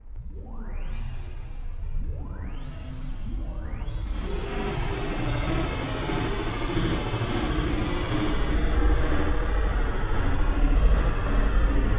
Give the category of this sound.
Sound effects > Electronic / Design